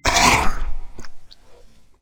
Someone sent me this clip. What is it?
Sound effects > Experimental
Creature Monster Alien Vocal FX (part 2)-061
Alien, bite, Creature, demon, devil, dripping, fx, gross, grotesque, growl, howl, Monster, mouth, otherworldly, Sfx, snarl, weird, zombie